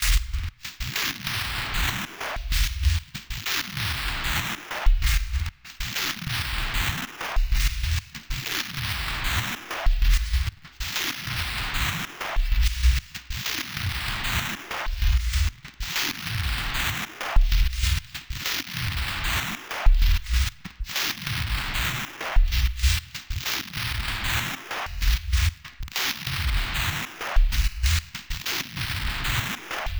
Soundscapes > Synthetic / Artificial
Error Sludge
Raw, digital error crafted into usable sludge.
pattern, glitchy, noise